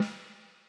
Music > Solo percussion
Snare Processed - Oneshot 162 - 14 by 6.5 inch Brass Ludwig
roll, hit, sfx, reverb, rim, oneshot, snare, rimshot, realdrum, snareroll, rimshots, ludwig, flam, fx, drumkit, drums